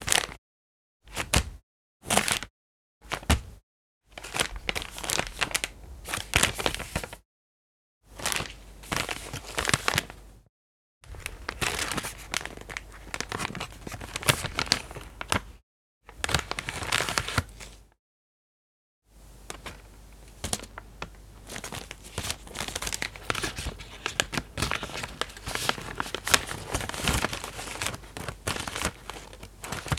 Sound effects > Objects / House appliances
Various handling noises from a small plastic bag squeezing, crinkling and subtle textures for use in sound design and foley Heres some clips chosen for this audio #0:00 - #0:01 - #0:02 - #0:03 - #0:04 - #0:08 #0:11 - #0:16 - #0:19 - #0:37 - #0:40 Recorded on Zoom H4n Pro (stock mics)
texture, noise, crinkling, bag, plastic